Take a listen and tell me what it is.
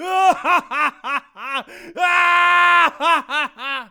Sound effects > Human sounds and actions
Mad scientist laughing crazy